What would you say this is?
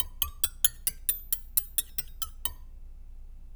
Sound effects > Objects / House appliances
knife and metal beam vibrations clicks dings and sfx-118
Beam, ding, FX, Klang, Metal, metallic, Perc, SFX, ting, Trippy, Vibrate, Vibration, Wobble